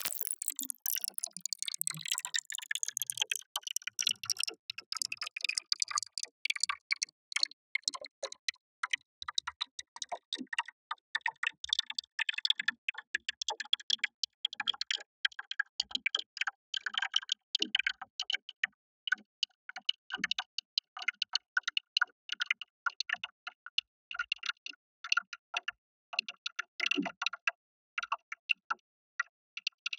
Sound effects > Electronic / Design
I was dragged a drumloop into phaseplant granular. Processed with KHS Filter Table, Vocodex, ZL EQ and Fruity Limiter. Sample used from: TOUCH-LOOPS-VINTAGE-DRUM-KIT-BANDLAB